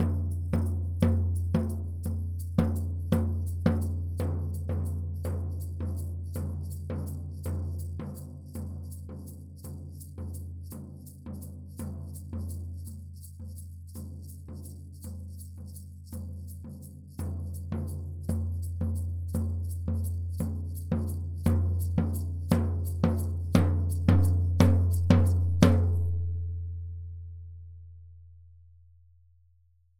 Music > Solo percussion
floor tom-polyrhythm with shaker - 16 by 16 inch
acoustic, beat, beatloop, beats, drum, drumkit, drums, fill, flam, floortom, instrument, kit, oneshot, perc, percs, percussion, rim, rimshot, roll, studio, tom, tomdrum, toms, velocity